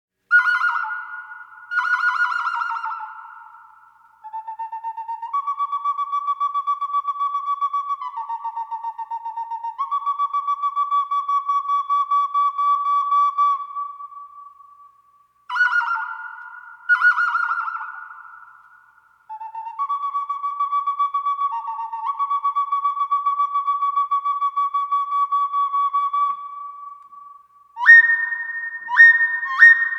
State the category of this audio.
Instrument samples > Wind